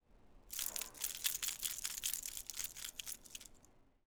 Sound effects > Objects / House appliances
key chain jingling